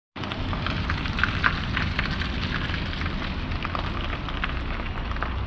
Sound effects > Vehicles
fiat punto
Car, field-recording, Tampere